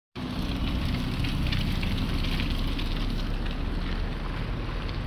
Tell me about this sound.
Sound effects > Vehicles
old opel astra
Sound of a combustion engine car passing, captured in a parking lot in Hervanta in December. Captured with the built-in microphone of the OnePlus Nord 4.
Car, field-recording, Tampere